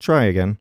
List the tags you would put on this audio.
Speech > Solo speech
2025
Adult
Calm
FR-AV2
Generic-lines
Hypercardioid
july
Male
mid-20s
MKE-600
MKE600
Sennheiser
Shotgun-mic
Shotgun-microphone
Single-mic-mono
Tascam
try-again
VA
Voice-acting

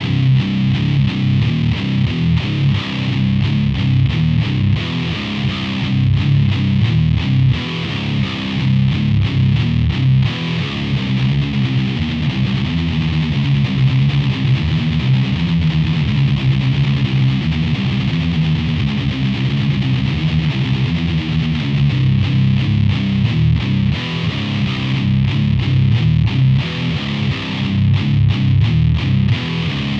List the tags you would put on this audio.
Music > Solo instrument
chug
distorted
electric-guitar
guitar
heavy
riff

